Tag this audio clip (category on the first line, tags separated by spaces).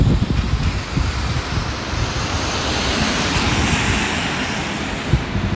Sound effects > Vehicles
car drive engine hervanta outdoor road tampere